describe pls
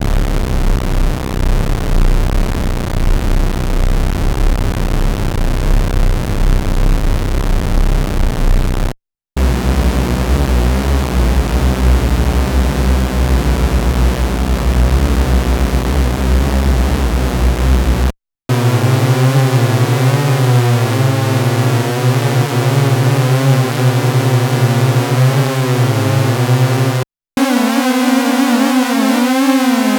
Instrument samples > Synths / Electronic
The hornet-cluster supersaw sound. A massive stereo spread of seriously detuned, unfiltered and harmonic-rich waveforms that sounds like a mad cluster of hornets. Five octaves of C. Ready to sting your listeners senseless. Created using VCV rack 2.
waveform, supersaw, electronic, harsh, pulse